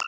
Instrument samples > Percussion
Organic-Water Snap 10.3
EDM, Snap, Glitch, Botanical, Organic